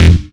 Instrument samples > Percussion
snarekick shaky 1
A snareroll. Not a mainsnare. • snare: 7×14" Tama John Tempesta Signature series • kick: 18×22" Tama Star Classic Quilted Sapele Bubinga A simultaneous snarekick (snare and kick) with multiplied attack, distorted and attenuated high-frequency decay. snarekick kicksnare snare-kick kick-snare tick tap click clack trigger trig percussion beat snareroll drumroll
kicksnare, tick, kick-snare, tap, trigger, snareroll, click, percussion, snare-kick, drumroll, roll, impact, strike-booster, snarekick, beat, trig, clack